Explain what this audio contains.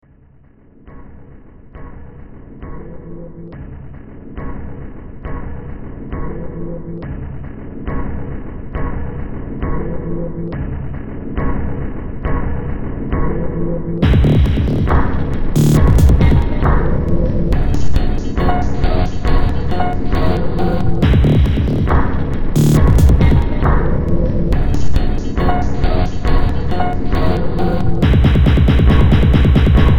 Music > Multiple instruments
Demo Track #3013 (Industraumatic)
Ambient, Cyberpunk, Games, Horror, Industrial, Noise, Sci-fi, Soundtrack, Underground